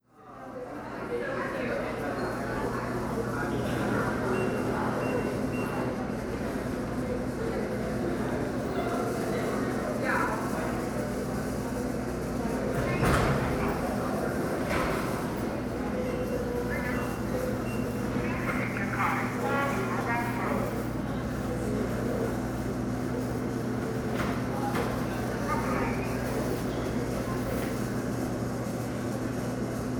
Soundscapes > Urban
Commuters at Lindner soundscape
The sounds of people going about their day and buses picking up students at the Lindner College of Business. Recorded on Samsung Galaxy A36 5G and edited in Audacity.
People, Soundscape